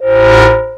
Instrument samples > Synths / Electronic
Strange Synth sound created in Audacity , frre to use
stab, sample, synth, electronic, music